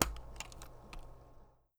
Sound effects > Objects / House appliances
COMCam-Blue Snowball Microphone Fuji Instax Mini 9 Camera, Film Compartment, Open Nicholas Judy TDC
A Fuji Instax Mini 9 camera film compartment opening.